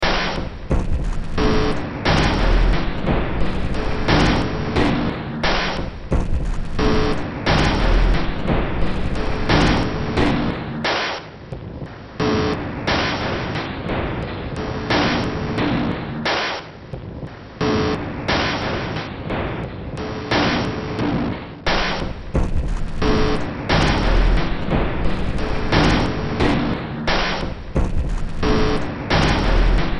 Music > Multiple instruments
Demo Track #3077 (Industraumatic)
Noise, Ambient